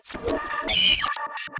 Sound effects > Experimental
Gritch Glitch snippets FX PERKZ-013
laser, impact, percussion, snap, abstract, impacts, experimental, fx, zap, edm, idm, glitchy, pop, hiphop, alien, crack, otherworldy, sfx, whizz, clap, glitch, perc, lazer